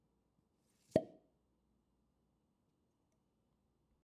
Sound effects > Objects / House appliances
OBJMisc Metal Cigar Tube Pop Usi Pro AB RambleRecordings
This is the sound of an empty metal cigar tube being pulled apart to open. It makes a very satisfying "pop" sound. The tube was held about 10cm in front of the mics. This was recorded in my apartment, which is a large room with brick walls, concrete floors, and a wooden ceiling.
foley, pop, sfx, tube